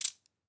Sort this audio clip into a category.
Sound effects > Other mechanisms, engines, machines